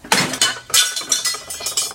Sound effects > Objects / House appliances
Kỳ Duyên drop stuff in kitchen. Record use iPad 2 2025.07.26 09:27